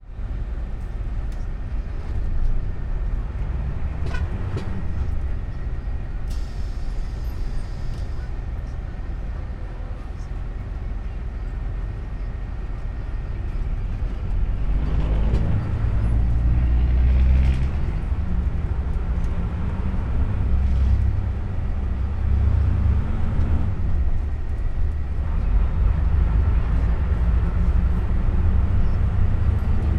Sound effects > Vehicles
250805 094811 PH Travelling in the back of a bus
Travelling in the back of a bus. I made this recording while sitting on the lasts seats, in the back of a bus travelling between Tanza and Manila, in the Philippines. One can hear the atmosphere in the vehicle, with the engine, the bumps of the road, the driver honking sometimes, as well as some people talking and/or eventually using their mobile phones to watch some videos or make some video calls (but nothing disturbing), and the doors of the bus opening and closing when someone comes in or exits. Recorded in August 2025 with a Zoom H5studio (built-in XY microphones). Fade in/out applied in Audacity.
doors, horn, Philippines, soundscape, travel